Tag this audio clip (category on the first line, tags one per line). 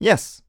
Speech > Solo speech
Voice-acting
Mid-20s
singletake
NPC
FR-AV2
Single-take
word
Male
Man
voice
talk
Human
joy
Vocal
dialogue
oneshot
happy
U67
yes
Tascam
approval
Video-game
joyful
Neumann